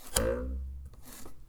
Sound effects > Other mechanisms, engines, machines
Woodshop Foley-034
strike, rustle, knock